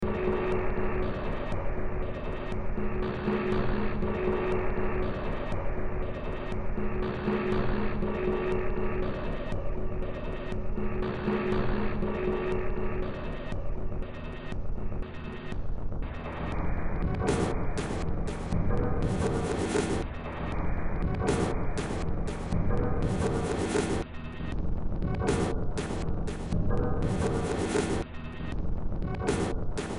Music > Multiple instruments
Demo Track #3399 (Industraumatic)
Ambient, Cyberpunk, Games, Horror, Industrial, Noise, Sci-fi, Soundtrack, Underground